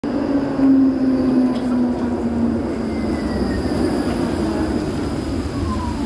Sound effects > Vehicles
A tram is slowing down speed, closing to a stop yet not fully stopping in the audio. Recorded in Hervanta, Finland, with a Samsung phone.